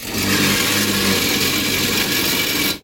Sound effects > Objects / House appliances
OBJFurn-Samsung Galaxy Smartphone, CU Chair Scrapes Nicholas Judy TDC
A chair scraping.
foley, chair, scrape, Phone-recording